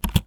Sound effects > Objects / House appliances
FUJITSU Computers Keyboard - spacebar Press Mono 2
Subject : A all white FUJITSU keyboard key being pressed. Date YMD : 2025 03 29 Location : Thuir Theatre, South of France. Hardware : Zoom H2N, MS mode. Using the middle side only. Handheld. Weather : Processing : Trimmed and Normalized in Audacity.
key-press, individual-key, Keyboard, Key, H2N, Zoom-Brand, Close-up, Zoom-H2N